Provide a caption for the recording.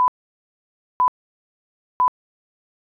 Sound effects > Other
Beep (ADR countdown)
This countdown tone is useful for indicate to the actors when they can say their lines in an ADR session.
1000Hz, ADR, Beep, Tone